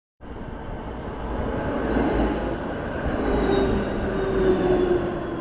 Sound effects > Vehicles
A Tram passes by
Passing, Tram, Tram-stop